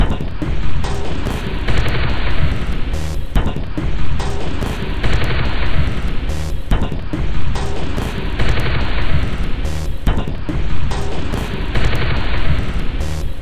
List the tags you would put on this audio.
Instrument samples > Percussion
Underground Samples Weird Loop Soundtrack Alien Dark Packs Industrial Drum Ambient Loopable

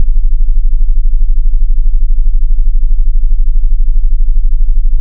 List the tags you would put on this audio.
Sound effects > Electronic / Design
20-Hz bass bassbase basshum basspulse bassthrob deep electronic foundation Fourier fundamental fundamentals harmonics hum Hz kicksine Lissajous low low-end megabass sinemix sinewave sinewaves sinusoid soundbuilding subspectral superbass ultrabass

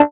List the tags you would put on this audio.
Instrument samples > Synths / Electronic
additive-synthesis fm-synthesis

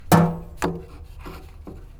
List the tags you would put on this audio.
Sound effects > Other mechanisms, engines, machines
bang perc oneshot thud wood little

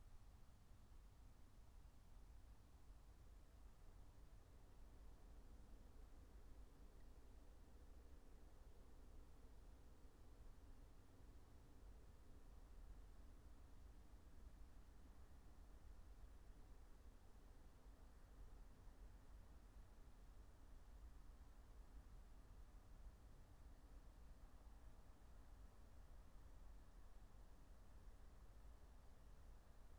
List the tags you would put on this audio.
Soundscapes > Nature
raspberry-pi alice-holt-forest field-recording nature natural-soundscape meadow soundscape phenological-recording

